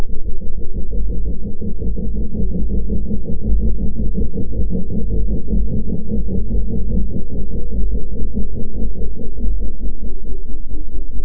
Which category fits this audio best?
Sound effects > Experimental